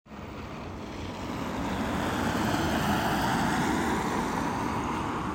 Sound effects > Vehicles
The sound of a car/cars passing. Recorded in Tampere on iPhone 14 with the Voice Memos app. The purpose of recording was to gather data from vehicles passing by for a binary sound classifier.